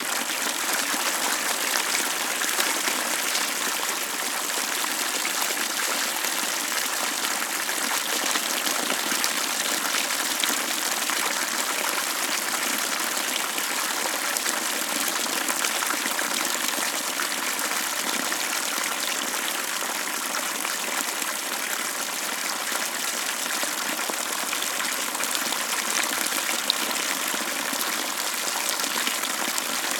Soundscapes > Nature
Loud Small Stream
drops, river, stream, trickle, water, waterstream